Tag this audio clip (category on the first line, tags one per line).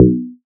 Instrument samples > Synths / Electronic
fm-synthesis
additive-synthesis